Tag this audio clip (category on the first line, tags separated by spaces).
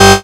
Instrument samples > Synths / Electronic
fm-synthesis bass additive-synthesis